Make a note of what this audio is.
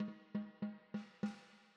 Music > Solo percussion
snare Processed - soft build dry to sizzle - 14 by 6.5 inch Brass Ludwig
sfx, snare, oneshot, percussion, flam, beat, kit, realdrum, perc, drum, brass, drumkit, drums, acoustic, processed, rimshots, rimshot, crack, rim, realdrums, ludwig, snaredrum, snareroll, reverb, hit, fx, roll, hits, snares